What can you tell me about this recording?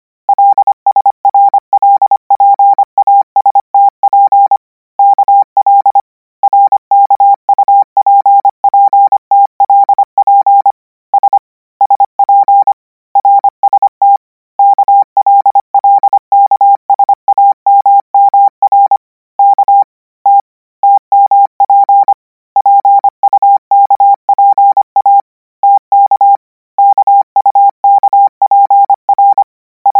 Sound effects > Electronic / Design
Koch 09 KMRSUAPTL - 380 N 25WPM 800Hz 90%
Practice hear characters 'KMRSUAPTL' use Koch method (after can hear charaters correct 90%, add 1 new character), 380 word random length, 25 word/minute, 800 Hz, 90% volume.
codigo, radio, morse, characters, code